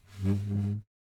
Sound effects > Objects / House appliances
Sliding a wooden chair across a floor.